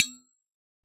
Sound effects > Objects / House appliances
Solid coffee thermos-012
sampling, percusive, recording